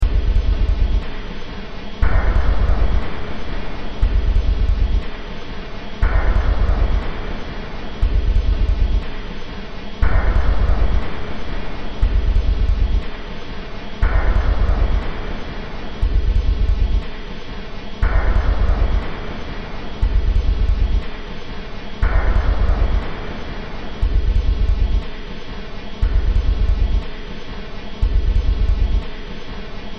Music > Multiple instruments
Demo Track #3739 (Industraumatic)
Ambient, Cyberpunk, Games, Horror, Industrial, Noise, Sci-fi, Soundtrack, Underground